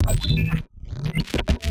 Sound effects > Electronic / Design
Optical Theremin 6 Osc Shaper Infiltrated-020
Alien, Analog, Chaotic, Crazy, DIY, EDM, Electro, Electronic, Experimental, FX, Gliltch, IDM, Impulse, Loopable, Machine, Mechanical, Noise, Oscillator, Otherworldly, Pulse, Robot, Robotic, Saw, SFX, strange, Synth, Theremin, Tone, Weird